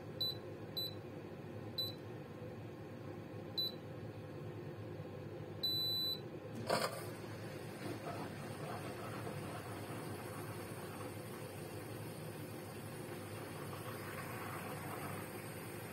Sound effects > Objects / House appliances
Turning on the dishwasher
Suitable for the sound effect of turning on the washing machine or dishwasher
dishwasher, homeappliances, machine